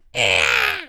Sound effects > Other
Little alien angry
Sounds like that one alien pet xD